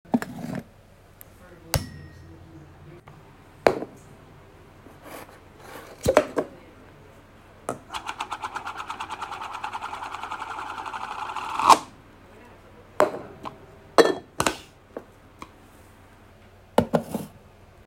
Sound effects > Objects / House appliances
Jar SFX
Recorded July 3, 2025. A jar was used for this audio that consists of multiple sounds, including opening and closing the jar and putting it on the shelf. Unwanted noises produced during the recording were trimmed, but, other than that, the audio is unedited.
close, foley, house-appliance, jar, open